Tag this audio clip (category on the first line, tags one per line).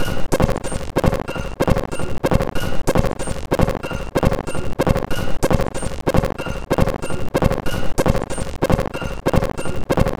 Instrument samples > Percussion
Samples
Drum
Underground
Industrial
Soundtrack
Loopable
Weird
Loop
Ambient
Dark
Packs
Alien